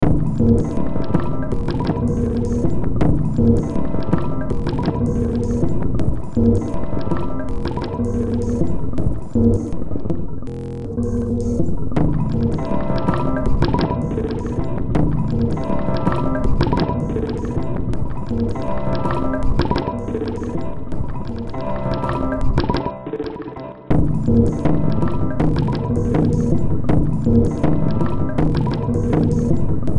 Music > Multiple instruments
Short Track #3576 (Industraumatic)
Ambient, Cyberpunk, Games, Horror, Industrial, Noise, Sci-fi, Soundtrack, Underground